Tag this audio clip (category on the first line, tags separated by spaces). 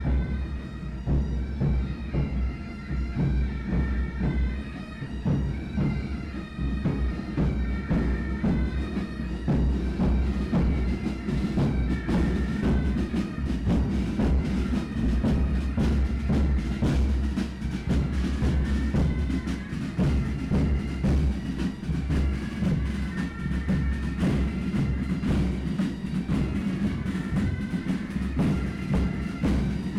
Music > Multiple instruments
India fanfare tambours